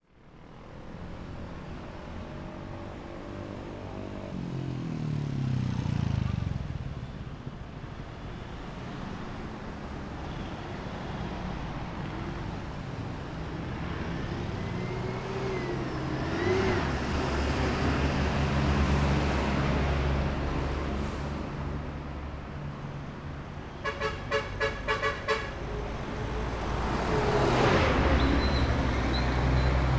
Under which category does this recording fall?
Soundscapes > Urban